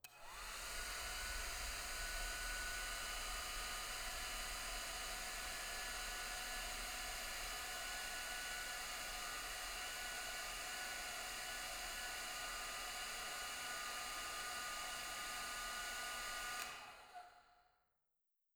Sound effects > Objects / House appliances
MACHAppl-Distant Hair Dryer, Turn On, Run, Off Nicholas Judy TDC
A hair dryer turning on, running and turning off in distance.
distance, home, house, run